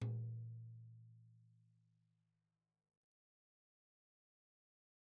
Music > Solo percussion
Med-low Tom - Oneshot 37 12 inch Sonor Force 3007 Maple Rack
acoustic, beat, drum, drumkit, drums, flam, kit, loop, maple, Medium-Tom, med-tom, oneshot, perc, percussion, quality, real, realdrum, recording, roll, Tom, tomdrum, toms, wood